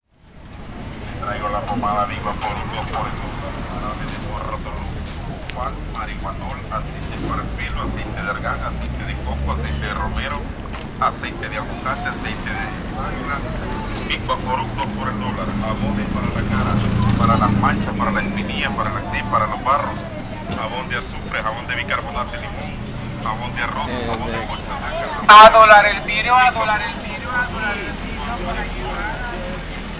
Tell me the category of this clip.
Speech > Conversation / Crowd